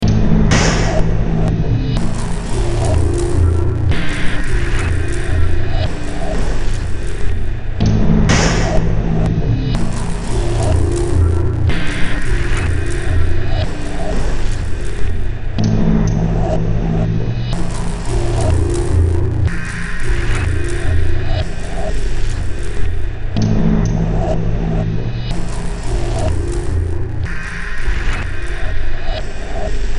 Music > Multiple instruments
Demo Track #3220 (Industraumatic)
Ambient, Cyberpunk, Games, Horror, Industrial, Noise, Sci-fi, Soundtrack, Underground